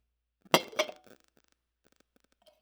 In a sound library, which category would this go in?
Sound effects > Objects / House appliances